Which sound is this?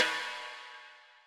Music > Solo percussion
Snare Processed - Oneshot 213 - 14 by 6.5 inch Brass Ludwig
acoustic, beat, brass, crack, drum, kit, realdrums, reverb, snare, snaredrum, snares